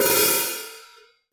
Music > Solo instrument
Vintage Custom 14 inch Hi Hat-018
Hat, Metal, Drum, Vintage, Custom, Kit, Drums, Cymbal, Cymbals, Percussion, HiHat, Oneshot, Perc, Hats